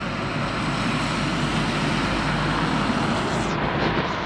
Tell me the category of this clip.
Soundscapes > Urban